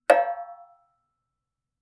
Sound effects > Other mechanisms, engines, machines
Dewalt 12 inch Chop Saw foley-037
Chopsaw, Tool, Tooth, Scrape